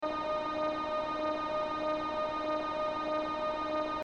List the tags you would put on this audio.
Sound effects > Other mechanisms, engines, machines
kharkiv auxilliary hardware bbe apu train tisu end metro 718 inverter hep vent ventilation power hum unit subway head static

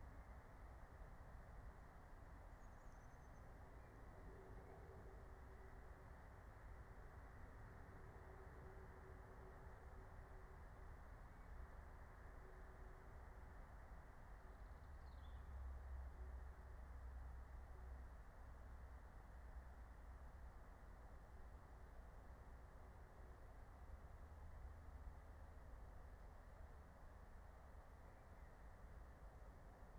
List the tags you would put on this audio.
Soundscapes > Nature
natural-soundscape meadow alice-holt-forest nature soundscape phenological-recording field-recording raspberry-pi

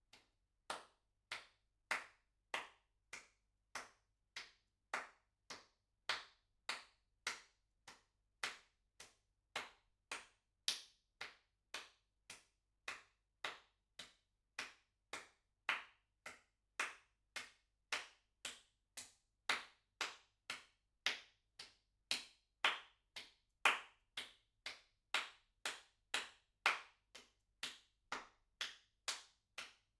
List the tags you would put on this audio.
Sound effects > Human sounds and actions
Applaud Applauding Applause AV2 clap clapping FR-AV2 individual indoor NT5 person Rode solo Solo-crowd Tascam XY

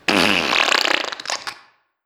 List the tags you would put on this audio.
Sound effects > Human sounds and actions
Dooka Funny Poo Fart doo-doo Kaka fard

